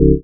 Instrument samples > Synths / Electronic
WHYBASS 4 Db
fm-synthesis, bass, additive-synthesis